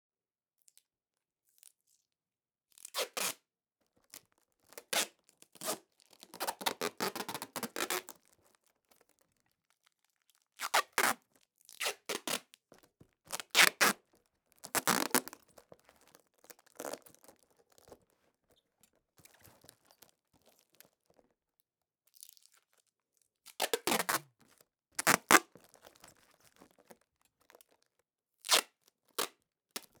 Sound effects > Other mechanisms, engines, machines
Working with scotch tape